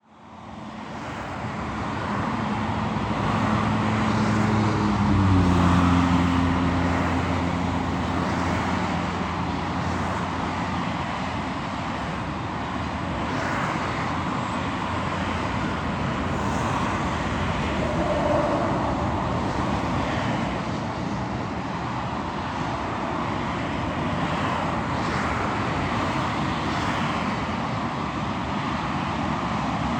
Soundscapes > Urban
Traffic from bridge highway A27 afternoon HZA
iPhone 16 stereo recording of cars passing beneath a bridge/viaduct. Lots of cars, afternoon.
road, traffic, highway, cars